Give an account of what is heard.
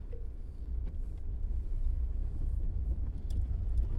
Sound effects > Vehicles
100525 2240 ermesinde carro tascamdr-40x 001
Sunday, May 11th Around 6:20 pm In Ermesinde Car (fx-v) Tascam dr-40x recorder Recorded outdoors, close to the sound source 100525_2240_ermesinde_carro_tascamdr-40x_01
car, driving, vehicle